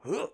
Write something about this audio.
Sound effects > Human sounds and actions
jump grunt 02
3 different types of jumps grunts recored by me using the sm58 shure can be used in short fillm, games,and more